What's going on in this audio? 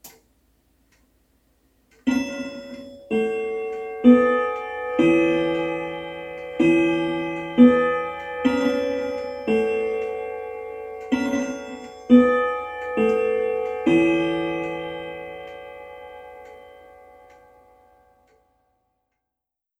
Sound effects > Other mechanisms, engines, machines
CLOCKChim-Samsung Galaxy Smartphone, CU Grandfather Clock, Third Quarter Chimes Nicholas Judy TDC
A grandfather clock's third quarter chime.
Phone-recording, quarter, third-quarter